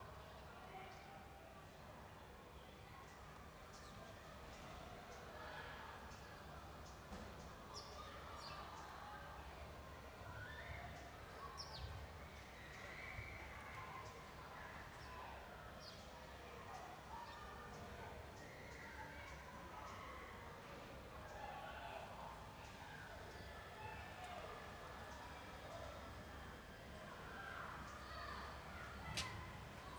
Soundscapes > Urban
Tirana Residential amb2
A quiet morning in a residential street in Tirana, Albania. Schoolyard nearby, a few birds, an air conditioner, some cars, people passing by. 2 x Micbooster EM272 mics in AB stereo (+/- 80cm)
schoolyard, Shqiptar, ambiance, ambience, city, air-conditioner, field-recording, albania, urban, tirana